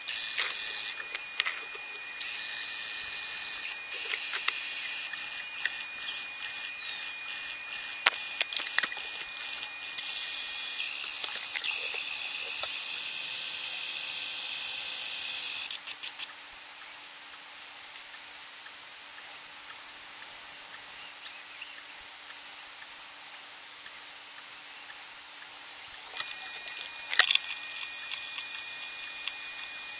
Sound effects > Objects / House appliances
Modern Washing Machine Process Overview
Full BEKO B5WFT78410W cycle sped up from 2h 54m 22s to 3m 30s and Normalized/Limiter-ed in Audacity. The purpose is to have a visual and audible representation of an entire washing program in a compressed form. The original is imperfect with me entering the room and shuffling about a few times. My favorite part is probably the down-howl around #3:19
laundry, washing-machine